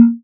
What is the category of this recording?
Instrument samples > Synths / Electronic